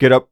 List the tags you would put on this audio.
Speech > Solo speech
chant up getup Neumann Mid-20s word dry get Vocal Man raw U67 FR-AV2 Male un-edited oneshot Tascam voice singletake hype Single-take

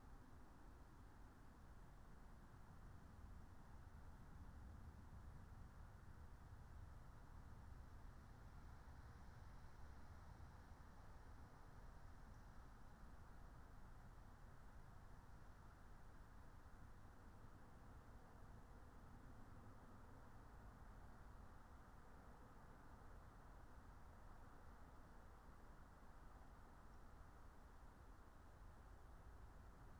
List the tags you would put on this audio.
Soundscapes > Nature
alice-holt-forest soundscape nature meadow field-recording phenological-recording natural-soundscape raspberry-pi

